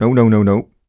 Speech > Solo speech
Displeasure - NoNoNoNo
dialogue, displeasure, displeasured, FR-AV2, Human, Male, Man, Mid-20s, Neumann, no, nope, NPC, oneshot, refusal, Sentence, singletake, Single-take, talk, Tascam, U67, Video-game, Vocal, voice, Voice-acting, Word